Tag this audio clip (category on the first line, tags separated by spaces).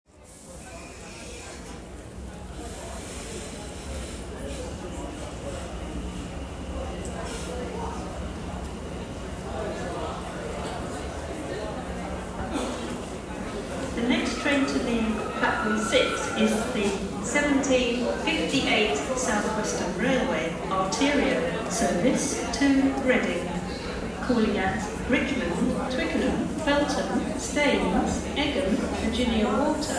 Sound effects > Vehicles
anno clapham-junction london platform railway station train